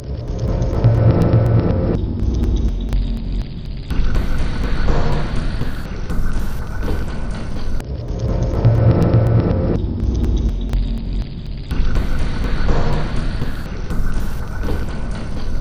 Instrument samples > Percussion
This 123bpm Drum Loop is good for composing Industrial/Electronic/Ambient songs or using as soundtrack to a sci-fi/suspense/horror indie game or short film.
Alien, Soundtrack, Loopable, Underground, Drum, Industrial, Samples, Weird, Ambient, Packs, Loop, Dark